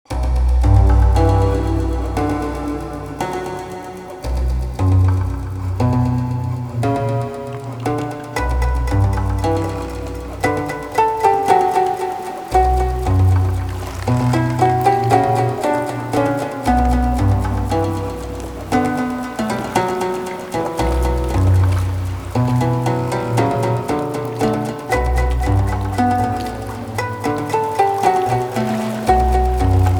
Music > Solo instrument
Solemn Asian String Melody Loop (Wind, Water, Wood)
A sad but pretty string loop with a bit of an Asian vibe, created in FL Studio using Kontakt, Rain and Wind open source VSTs, as well as Fabfilter, Objekt Delay, and Izotope plugins. Processed further in Reaper. Could be good in a videogame sequence, RPG, or fantasy cinema